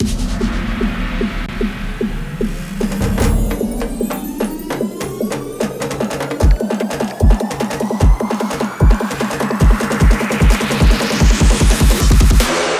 Instrument samples > Percussion
FILTH Build 2 150BPM
Made and mixed in GarageBand
build, drum, riser